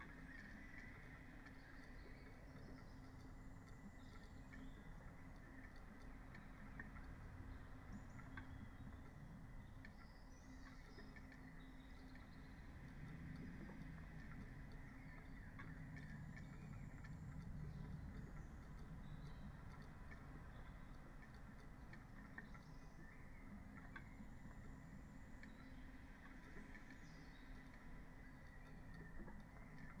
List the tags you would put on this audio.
Soundscapes > Nature
data-to-sound
natural-soundscape

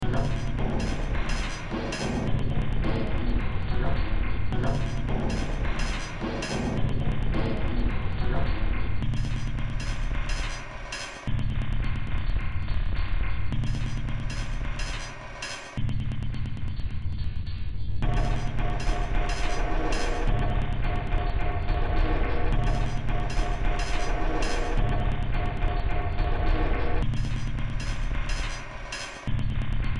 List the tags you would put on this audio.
Music > Multiple instruments
Ambient
Cyberpunk
Games
Horror
Sci-fi
Soundtrack
Underground

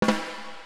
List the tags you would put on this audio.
Music > Solo percussion
hits
kit
reverb

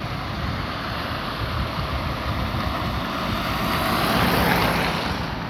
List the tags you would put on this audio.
Soundscapes > Urban
car
engine
vehicle

